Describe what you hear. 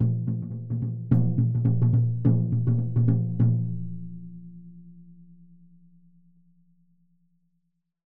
Music > Solo percussion
mid low-tom loose beat 2 12 inch Sonor Force 3007 Maple Rack
tomdrum, maple, drum, real, wood, drums, beat, quality, med-tom, oneshot, flam, toms, roll, kit, percussion, Medium-Tom, drumkit, recording, perc, loop, Tom, realdrum, acoustic